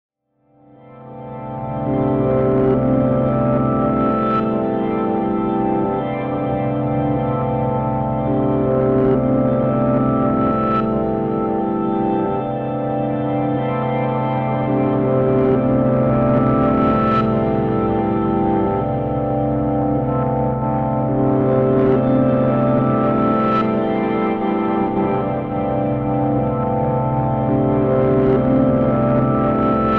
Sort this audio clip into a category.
Music > Other